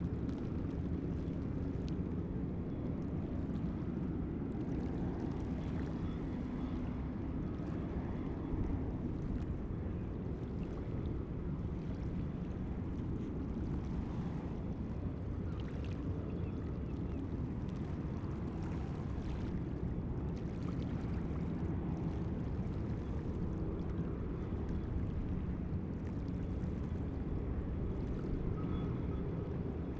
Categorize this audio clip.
Soundscapes > Urban